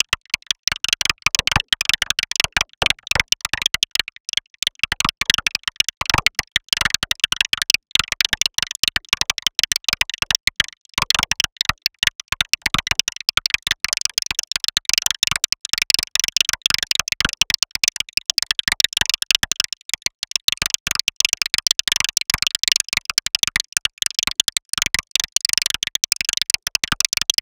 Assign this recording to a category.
Sound effects > Electronic / Design